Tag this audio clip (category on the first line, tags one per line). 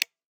Sound effects > Human sounds and actions
switch
activation
click
interface
off
button
toggle